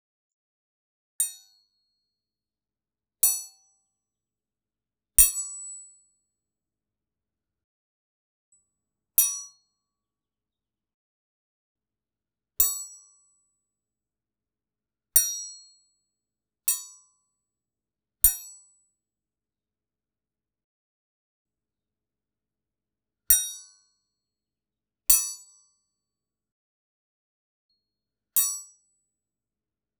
Sound effects > Objects / House appliances
tmnt 2012 inspired silverware knives leo and raph sword sai like ringouts 04102025
sounds of silverware knives hitting against each other, first half is the original and the 2nd half is the slow speed version.